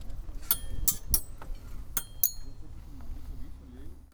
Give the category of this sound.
Sound effects > Objects / House appliances